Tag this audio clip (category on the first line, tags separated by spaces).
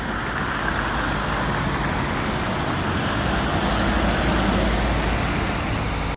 Soundscapes > Urban
bus public transportation